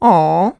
Speech > Solo speech
Affectionate Reactions - Awwn
reaction, voice, NPC, Voice-acting, Mid-20s, Tascam, Single-take, Video-game, Male, U67, dialogue, Man, oneshot, talk, Human, affectionate, Vocal, Neumann, aww, FR-AV2, singletake